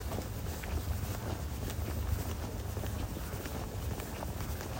Sound effects > Human sounds and actions
Walking march texture
Walking outside with a steady pace with fabric sounds. Recorded with an Iphone mic.
Walking, Nature